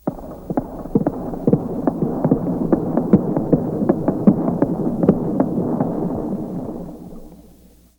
Sound effects > Natural elements and explosions
Fireworks echoing on facades
Fireworks echoing against the facades in my street